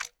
Sound effects > Other mechanisms, engines, machines
clock tickA1
single tick, isolated Works best in tandem with the paired sound (ie: clock_tickA1 and clock_tickA2) for the back and forth swing.
clacking, time, clock, minute, ticks, second, seconds, hand, tick-tock, ticking